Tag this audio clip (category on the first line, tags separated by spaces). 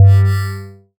Instrument samples > Synths / Electronic
additive-synthesis fm-synthesis